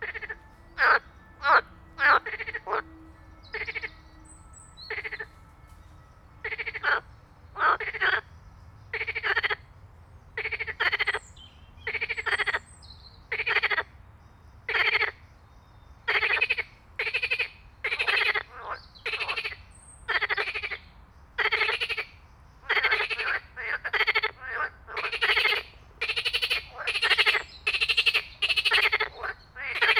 Sound effects > Animals
Frog pond - Albi Ruisseau des Causseles - MS 20250519
Frogs or toads in Albi Date : 2025 05 19 14h07 A MS raw audio converted to MS stereo from a Zoom H2n. Recorded in Albi. Next to a small pond at the very end of the eastern side of Ruisseau de Caussels. There's a sort of motorway to the East.
05, 2025, Zoom